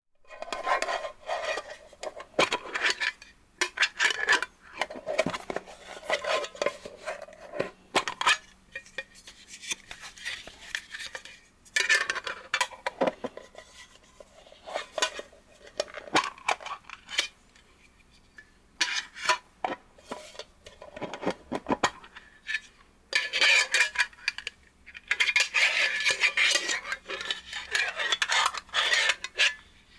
Sound effects > Objects / House appliances
OBJCont Small-Tin1
Handling, opening and closing a small tin box in my hands in a small office environment.